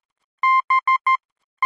Sound effects > Electronic / Design
A series of beeps that denote the letter B in Morse code. Created using computerized beeps, a short and long one, in Adobe Audition for the purposes of free use.